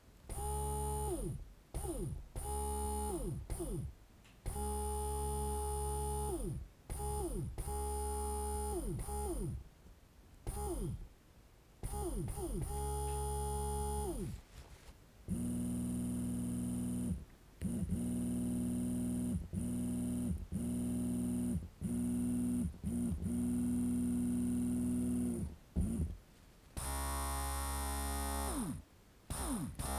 Sound effects > Human sounds and actions
Electric motor running with a steady mechanical hum and vibration.
vibration, machine, industrial, motor, hum, electric, mechanical